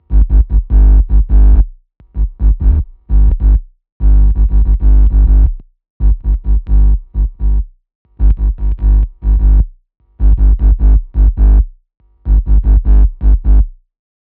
Sound effects > Experimental
Automotive circuit tester hooked up to RCA to create experimental touch bass, loops created with Ableton